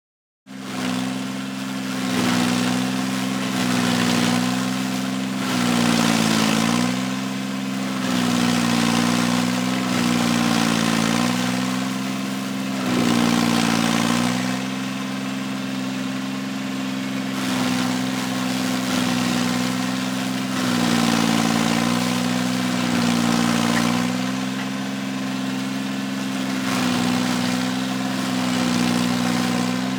Sound effects > Other mechanisms, engines, machines
Diesel stump grinder in action on 4" to 12" stumps. For all you stump grinder fans, it's a Carlton 4400-4 Turbo Diesel. Rode NTG-3 into an SD Mixpre6.
arborist, landscape, stump, grinder, forestry, stump-grinder